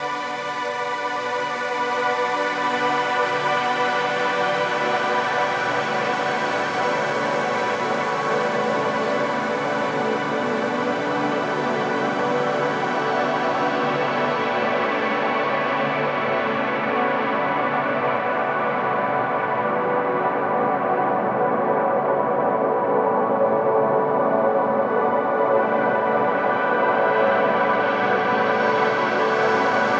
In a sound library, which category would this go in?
Instrument samples > Synths / Electronic